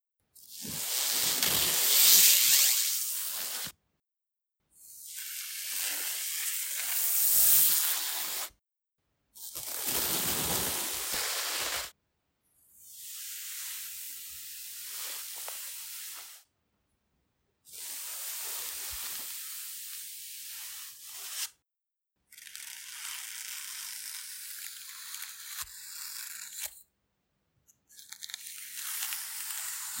Sound effects > Other
Feather - Rustle
Feather stroked on a soft textile surface * No background noise. * No reverb nor echo. * Clean sound, close range. Recorded with Iphone or Thomann micro t.bone SC 420.
tear, feather, bird, rip, scratch, soft, cloth, rustle, plume, tearing, textile, clothes, clothing, touch, feathers, moving, movement, stroke, ripping, shirt, paper, birds, caress, fabric, rustling